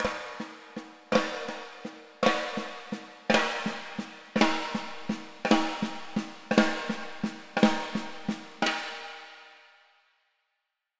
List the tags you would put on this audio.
Music > Solo percussion
fx rim realdrum rimshot brass crack oneshot processed drums acoustic rimshots percussion snare sfx drum hit hits roll perc kit reverb flam snareroll snaredrum ludwig beat realdrums drumkit snares

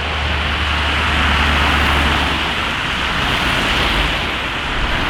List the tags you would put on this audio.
Sound effects > Vehicles
field-recording rainy

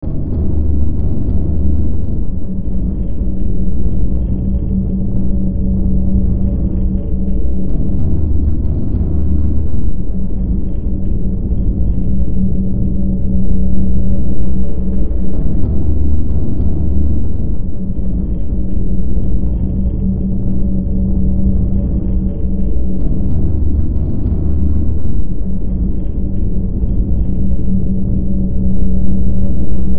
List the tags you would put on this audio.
Soundscapes > Synthetic / Artificial
Horror Gothic Noise Soundtrack Weird Darkness Games Ambience Drone Underground Hill Silent Survival Sci-fi Ambient

